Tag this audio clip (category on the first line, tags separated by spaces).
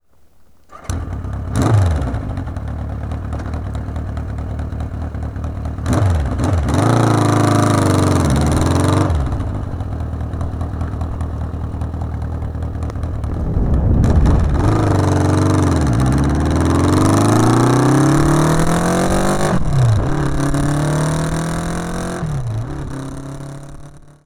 Sound effects > Vehicles
Classic Honda